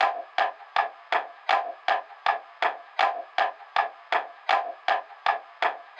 Music > Solo percussion
guitar percussion loop 2
acoustic, guitar, techno